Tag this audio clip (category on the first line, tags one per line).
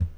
Sound effects > Objects / House appliances
plastic
cleaning
tool
tip
lid
water
kitchen
object
scoop
metal
clatter
drop
garden
clang
hollow
slam
spill
liquid
household
container
handle
debris
bucket
shake
pour
fill
carry
foley
knock
pail